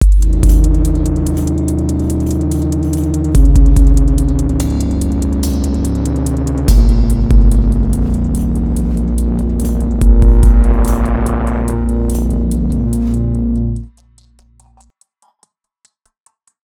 Music > Multiple instruments
Chill Sub Girt Loop with faster HaTS and kick 72bpm
A collection of electronic beats and loops with bass and other instrumentation , Hip Hop grooves, subby chops and Percussion mixed with FL Studio and a ton of effects processing, processed in reaper
Bass, Bassloop, Beat, Beats, Chill, Downtempo, EDM, Funky, FX, Groove, Groovy, Heavy, Hip, HipHop, Hop, IDM, Loop, Loops, Melodies, Melody, Perc, Percussion, Sample, Soul, Sub, Subloop, TripHop, Trippy